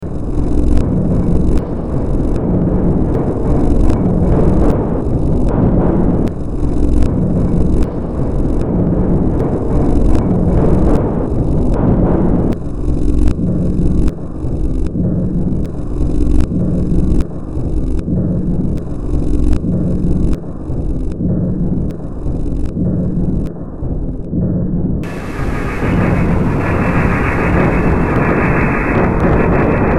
Music > Multiple instruments
Demo Track #3773 (Industraumatic)
Games, Sci-fi, Ambient, Industrial, Soundtrack, Underground, Cyberpunk, Horror, Noise